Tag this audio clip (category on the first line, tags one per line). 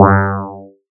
Instrument samples > Synths / Electronic
fm-synthesis
bass